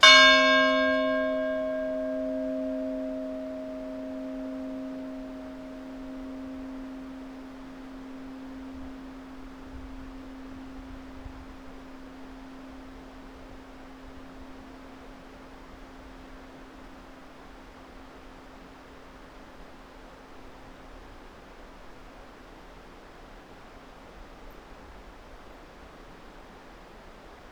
Sound effects > Other mechanisms, engines, machines
250712 01h02 Esperaza Church bell - MKE600
Subject : Esperaza chuch bell recorded from the bin area. A high quality snippet from a longer recording. Sennheiser MKE600 with stock windcover P48, no filter. Weather : Clear sky, little wind. Processing : Trimmed in Audacity.
11260, 2025, Aude, bell, chuch, church-bell, Esperaza, FR-AV2, Hypercardioid, Juillet, July, MKE-600, MKE600, ring, Sennheiser, Shotgun-mic, Shotgun-microphone, Single-mic-mono, Tascam